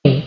Sound effects > Other
Hit Sound Effect
my microphone sucks and made this sound lol